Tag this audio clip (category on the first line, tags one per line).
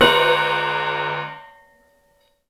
Music > Solo instrument
Paiste
Metal
Drums
Crash
FX
GONG
Percussion
Kit
Hat
Cymbals
Custom
Cymbal
Drum
Oneshot
Sabian
Perc
Ride